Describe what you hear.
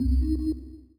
Sound effects > Electronic / Design
Digital UI SFX created using Phaseplant and Portal.